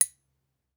Sound effects > Other mechanisms, engines, machines
Metal Hit 01
Recorded in my Dad's garage with a Zoom H4N, 2017.
hit, sample